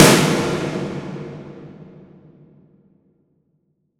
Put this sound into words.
Sound effects > Electronic / Design
SNARE BOMB ONE
A snare bomb created with Purafied Audio's Liquid Death Snare.
metal, percussion, metalcore, snare-bomb, djent, one-shot, sample, drum, bomb, drums, snare, snarebomb